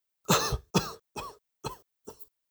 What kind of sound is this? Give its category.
Sound effects > Human sounds and actions